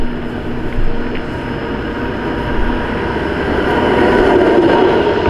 Sound effects > Vehicles
Tram00051623TramApproaching
Tram approaching the recording device. Recorded during the winter in an urban environment. Recorded at Tampere, Hervanta. The recording was done using the Rode VideoMic.